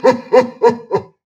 Sound effects > Human sounds and actions
low-pitched laugh
Recorded July 24, 2025. A laugh I did but pitched down 8 semitones. An almost-5-years-old HP computer (my computer) was used to record the sound.
voice, male, laugh, laughing, santa, laughter